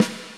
Music > Solo percussion
Snare Processed - Oneshot 38 - 14 by 6.5 inch Brass Ludwig
brass, drumkit, drums, flam, perc, realdrums, rimshot, rimshots, snare